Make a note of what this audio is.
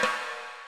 Music > Solo percussion
Snare Processed - Oneshot 96 - 14 by 6.5 inch Brass Ludwig
drumkit, crack, acoustic, snareroll, rimshots, flam, rimshot, snare, kit, snares, ludwig, hits, perc, drums, processed, oneshot, reverb, rim, hit, beat, drum, fx, roll, realdrum, brass, snaredrum, sfx, percussion, realdrums